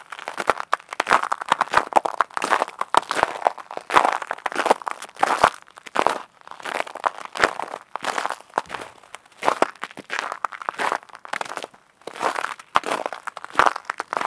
Sound effects > Human sounds and actions
Frozen snow walk
Sounds of walking on frozen snow Zoom H2E